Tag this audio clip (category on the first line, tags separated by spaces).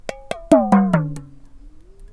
Sound effects > Objects / House appliances
bonk clunk drill fieldrecording foley foundobject fx glass hit industrial mechanical metal natural object oneshot perc percussion sfx stab